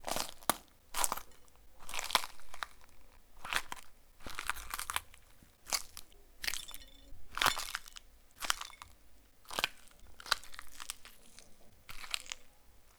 Sound effects > Objects / House appliances
Pepper crunching
The classic foley sound of a bell pepper being crunched
bite, crunch, food, pepper